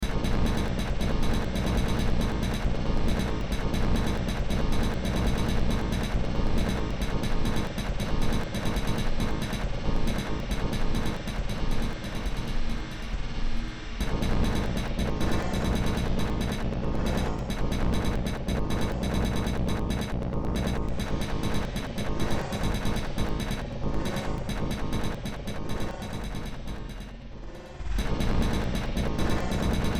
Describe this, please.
Music > Multiple instruments
Short Track #3361 (Industraumatic)
Ambient, Cyberpunk, Games, Horror, Industrial, Noise, Sci-fi, Soundtrack, Underground